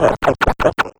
Sound effects > Electronic / Design
Joker Skill Effect
A punster steals the hero's sword before his eyes. Random (chaos button) Effectrix effects used (X-Loop being the basis).
ability, abstract, dnd, dungeons-and-dragons, effect, fantasy, fun, funny, game, game-design, gaming, jester, jittery, magic, magical, magician, prank, prankster, RPG, sci-fi, scifi, sorcerer, sorcery, sound-design, spell, strange, video-game, vst, weird, weirdo